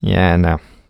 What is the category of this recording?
Speech > Solo speech